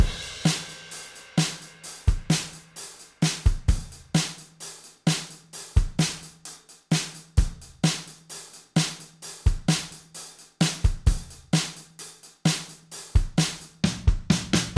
Music > Other
Drum beat - 8 bars, 4:4 time -w- intro & outro fills
8 bars Drum beat -w- Intro & Outro fills. 4/4 time. Good for injecting into your DAW. Recorded on 2 tracks panned hard left & right on a Tascam 24 track from Boss DR880 vintage Drum machine.
Beats, DAW